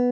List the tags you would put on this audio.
Instrument samples > String
guitar
sound

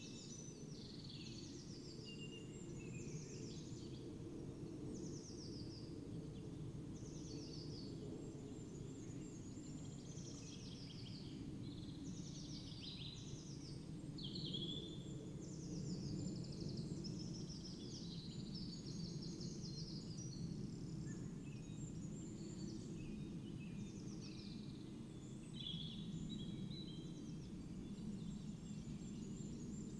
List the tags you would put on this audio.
Soundscapes > Nature
phenological-recording
Dendrophone
alice-holt-forest
field-recording
sound-installation
soundscape
modified-soundscape
nature

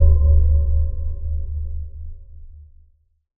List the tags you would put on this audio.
Sound effects > Electronic / Design
BACKGROUND
BASSY
BOOMY
DEEP
HIT
HITS
IMPACT
IMPACTS
LOW
PUNCH
RATTLING
RUMBLE
RUMBLING

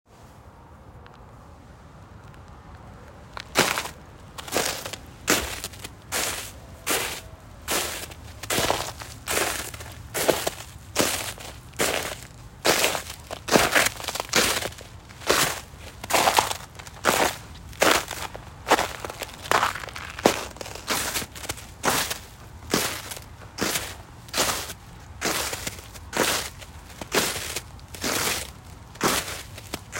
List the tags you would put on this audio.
Sound effects > Human sounds and actions
cold
crunch
footsteps
nature
snow
walking
winter

